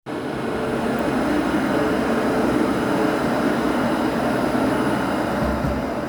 Soundscapes > Urban
voice 30-11-2025 2 tram
Tram, Rattikka